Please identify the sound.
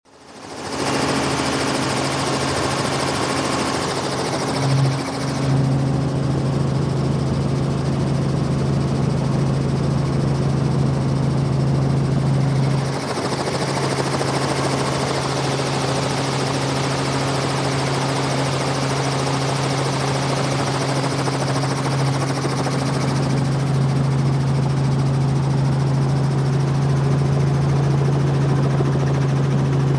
Sound effects > Other mechanisms, engines, machines
Helicopter fly
Recorded inside ( back seat ) of a Robinson helicopter with the doors off. Recording taken from both sides.
chopper, flying, heli, helicopter